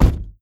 Sound effects > Vehicles
VEHDoor-Samsung Galaxy Smartphone Car Door, Close Nicholas Judy TDC
A car door closing.
car, close, door, foley, Phone-recording